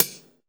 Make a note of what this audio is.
Instrument samples > Percussion
HR V10 HiHat closed
cymbals, digital, drum, drums, Hihat, machine, one-shot, physical-modelling, sample, stereo